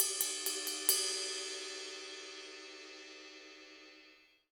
Music > Solo instrument
Paiste Custom 22inch Ride rhythm-002
Recording from my studio with a custom Sonor Force 3007 Kit, toms, kick and Cymbals in this pack. Recorded with Tascam D-05 and Process with Reaper and Izotope
Drum, Kit, Custom, GONG, Ride, Metal, Cymbal, FX, Drums, Percussion, Paiste, Hat, Crash, Perc, Sabian, Oneshot, Cymbals